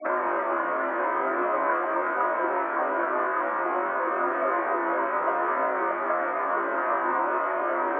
Sound effects > Other mechanisms, engines, machines
Drone I used for hyperspace in a game. Comes from a heavily processed sample of a kettle boiling.